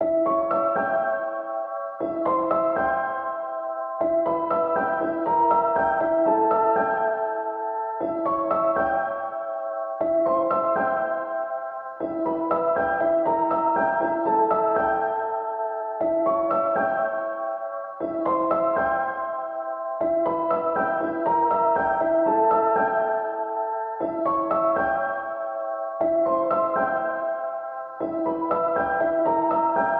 Music > Solo instrument
Piano loops 162 efect 4 octave long loop 120 bpm
120, 120bpm, free, loop, music, piano, pianomusic, reverb, samples, simple, simplesamples